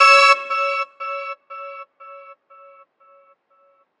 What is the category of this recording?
Sound effects > Electronic / Design